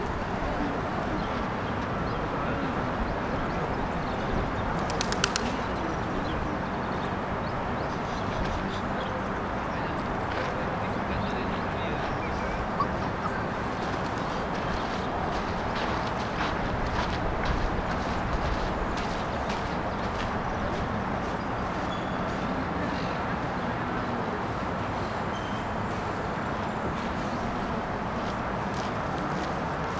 Soundscapes > Urban
20250312 JardinsMontbau traffic pigeons wind pleasant soft
Jardins, Montbau, pigeons, pleasant, soft, traffic, wind